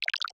Instrument samples > Percussion
Organic-Water Snap-5
This snap synthed with phaseplant granular, and used samples from bandlab's ''FO-REAL-BEATZ-TRENCH-BEATS'' sample pack. Processed with multiple ''Khs phaser'', and Vocodex, ZL EQ, Fruity Limiter. Enjoy your ''water'' music day!